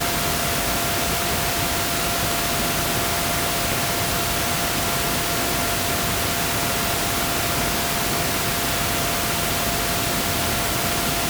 Sound effects > Electronic / Design
Static recorded using soma and zoom h2n.